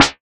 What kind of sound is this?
Instrument samples > Synths / Electronic
SLAPMETAL 4 Db
fm-synthesis, additive-synthesis, bass